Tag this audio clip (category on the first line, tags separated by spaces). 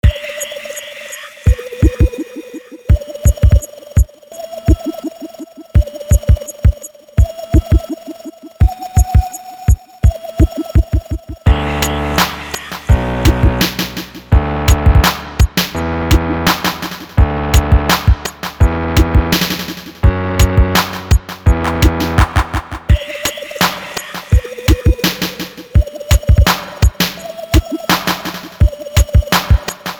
Music > Other
beat free soundtrack abstract beats rap hiphop hip drums instrumental triphop glitch loop trap hop